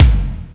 Instrument samples > Percussion
tom jazz 1
tom, Sonor, 16x16, Korg, Premier, drum, Sakae, Tama, PDP, DW, floor, Pearl